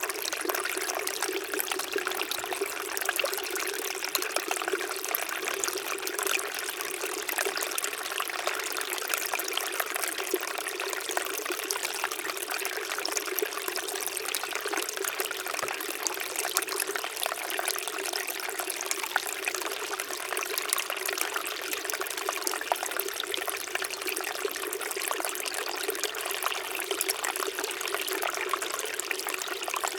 Soundscapes > Nature
Recorded with Zoom H5 in a forest in switzerland